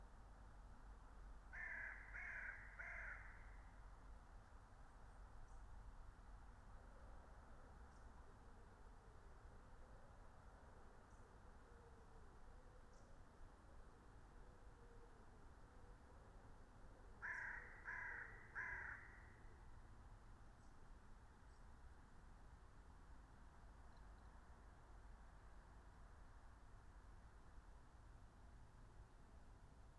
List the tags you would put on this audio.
Soundscapes > Nature
meadow soundscape field-recording natural-soundscape nature alice-holt-forest phenological-recording raspberry-pi

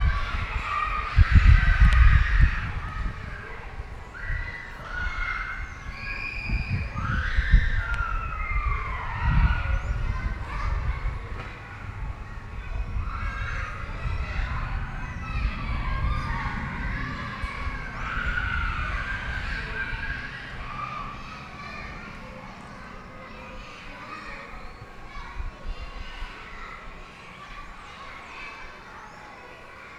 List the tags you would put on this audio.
Soundscapes > Indoors
children escola infantil kids kindergarten patio playground portugues portuguese school school-yard vozerio zoomh1n

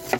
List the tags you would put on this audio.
Sound effects > Objects / House appliances
credit-card debit-card machine Phone-recording swipe through

